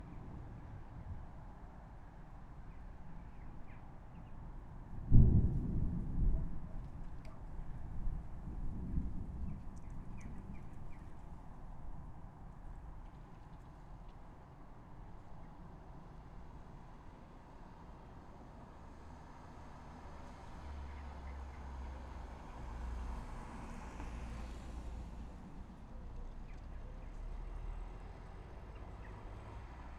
Soundscapes > Urban
Thunderstorm in Leicestershire, England.